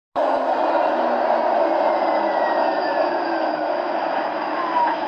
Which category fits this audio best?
Sound effects > Vehicles